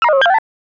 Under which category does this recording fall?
Sound effects > Other mechanisms, engines, machines